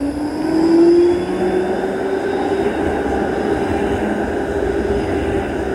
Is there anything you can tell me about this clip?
Sound effects > Vehicles
tram sunny 08
motor, sunny, tram